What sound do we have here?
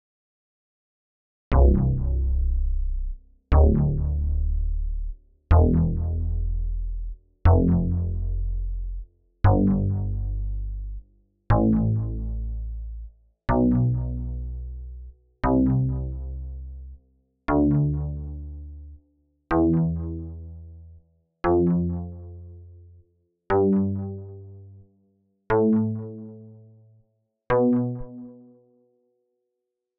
Instrument samples > Synths / Electronic
EVAN P - PATCH IT UP - BASS
bass w delay